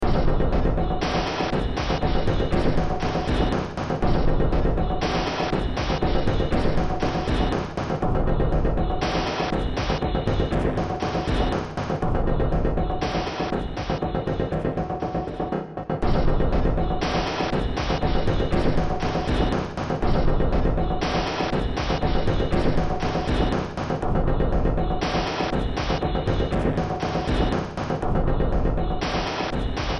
Music > Multiple instruments
Demo Track #2943 (Industraumatic)
Ambient, Cyberpunk, Games, Horror, Industrial, Noise, Sci-fi, Soundtrack, Underground